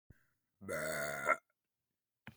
Sound effects > Other
its a very fake burp
blurp, voice, human